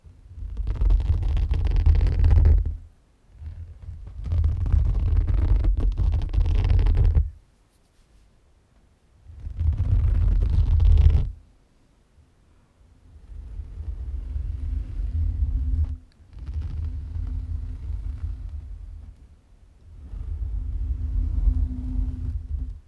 Sound effects > Objects / House appliances
Fingernails on side of matterss 3x then 3x different direction
The mattress fabric has a bit of texture, the first 3 scrapes were done against the texture grooves and produce a louder more aggressive sound. The next 3 scrapes were done in the direction of the grooves and resulted in a smoother sound. You can also hear an artifact: Especially at the end of scrape #1, in scrape #3, scrape #4 and scrape #6 there is a bit of resonance that comes from the recorder. I used my Zoom H1, and that resonance is the same that appears on recorder handling noise, if I am holing the recorder in my and. This happened because the recorder was physically on top of the mattress and thus was able to 'couple' with this object. The vibrations on the mattress made the recorder plastic case resonate. I did not realize that at the time, and I decided to keep the recording nonetheless (as a happy accident).
rubbing, mattress, friction, scrapping, Dare2025-08, fingernails